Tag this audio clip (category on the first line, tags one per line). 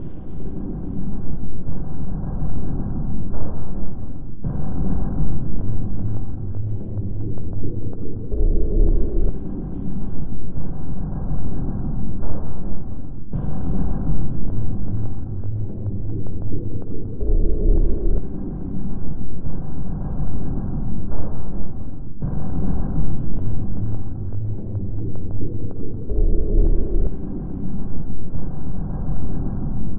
Instrument samples > Percussion
Loop
Underground
Weird
Industrial
Dark
Soundtrack
Drum
Loopable
Packs
Ambient
Samples
Alien